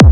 Instrument samples > Percussion
Phonk Kick 1
Layered FPC Kick 7 and Minimal kick 53 from FL studio. I just did multiple distortion and EQ works with ZL EQ and Waveshper, and tweak pogo and pitch amount in Flstudio sampler.
House-music, Kick, Phonk, hard, distorted